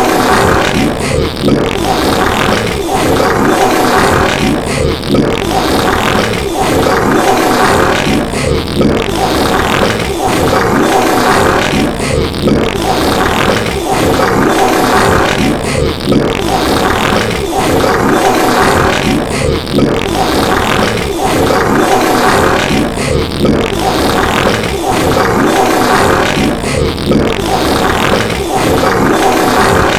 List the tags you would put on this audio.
Sound effects > Human sounds and actions
one
bubble-up
belch
rift
exhale
cut-the-cheese
eruct
let-one-slip
burp
diarrhea
vomit
poot
flatulate
toot

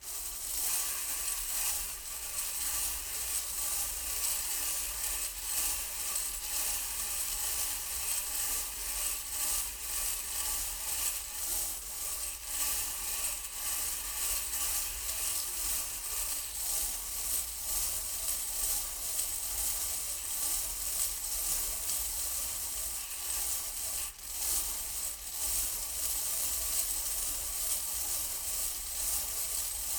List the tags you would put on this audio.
Sound effects > Objects / House appliances
Blue-brand
roll
bingo
bingo-cage
Blue-Snowball
foley

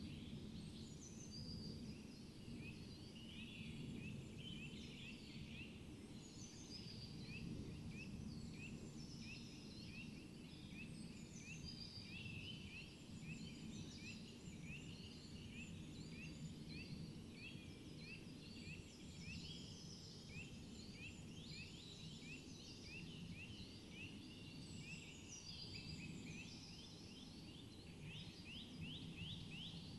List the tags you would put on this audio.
Soundscapes > Nature
weather-data
phenological-recording
raspberry-pi
natural-soundscape
artistic-intervention
sound-installation
soundscape
nature
alice-holt-forest
modified-soundscape
data-to-sound
Dendrophone
field-recording

